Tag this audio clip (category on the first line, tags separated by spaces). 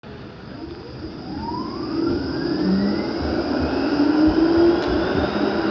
Soundscapes > Urban
rail tram tramway